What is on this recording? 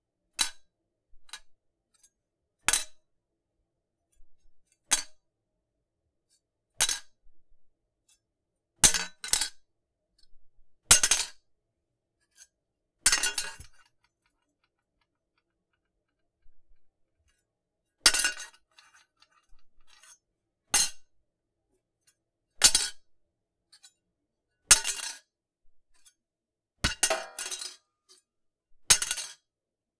Sound effects > Objects / House appliances
tin can lid
Picking up and dropping a metal lid of a tin can on a countertop multiple times.